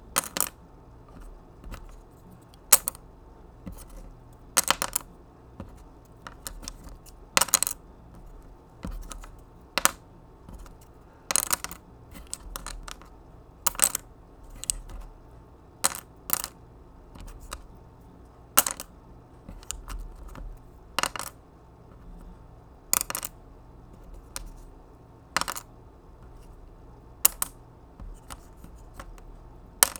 Sound effects > Objects / House appliances
WOODImpt-Blue Snowball Microphone, CU Sticks, Popsicle, Drop Nicholas Judy TDC
Popsicle sticks dropping.
Blue-brand, Blue-Snowball, drop, foley, popsicle, stick